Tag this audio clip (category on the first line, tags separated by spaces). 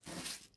Sound effects > Other
Indoor Chef Kitchen Soft Vegetable Chopping Cooking Knife Cook Chop Chief